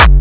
Instrument samples > Percussion
OldFiles-Classic Crispy Kick 1-F#
brazilianfunk, Crispy, Distorted, Kick, powerful, powerkick